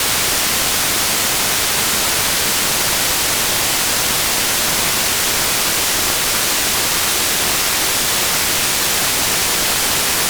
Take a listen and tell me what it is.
Instrument samples > Synths / Electronic
NOISE Unison PAN spread Sequential OB-6
Noise Oscillator - Sequential OB-6 in Unison Mode with Panorama Spread
Sequential, Noise, Analog, OB-6, Synthesizer, Oscillator